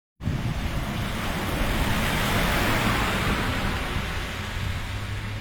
Sound effects > Vehicles
A bus passes by
Passing, bus-stop